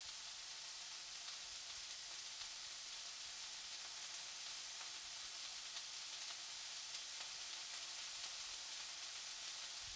Sound effects > Objects / House appliances
Food sizzling - Distant
Kitchen cooking sound recorded in stereo.